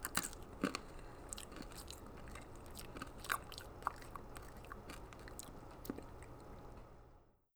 Sound effects > Human sounds and actions
FOODEat-Blue Snowball Microphone Tic Tac Nicholas Judy TDC
Someone eating a tic tac.
tic-tac, Blue-Snowball